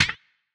Sound effects > Electronic / Design
Aggressive Button Tap / UI Tap Hit
A short, percussive tap sound — punchy, snare-like, and great for UI feedback, game menus, or digital button presses. The transient is crisp with a light, natural resonance and a subtle short reverb tail. Slightly aggressive in attack but easily EQ’d or softened for different contexts. Completely original — not sampled or synthesized from any external source.